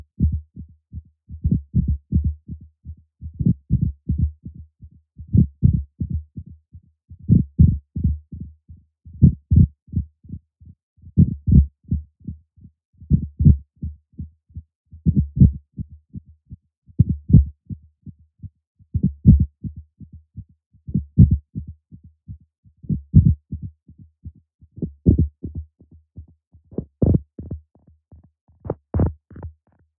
Instrument samples > Synths / Electronic
D# Bass 82bpm
Hello, I synthesize these sounds in ableton. Use it.
bass, electro, electronic, loop, synth, techno